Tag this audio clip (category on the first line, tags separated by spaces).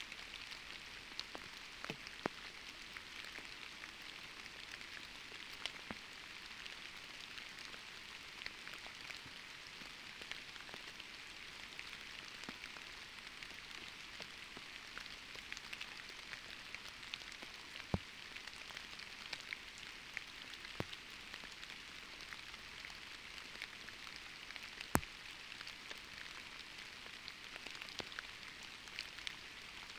Soundscapes > Nature
sound-installation natural-soundscape nature artistic-intervention alice-holt-forest weather-data modified-soundscape raspberry-pi soundscape data-to-sound field-recording Dendrophone phenological-recording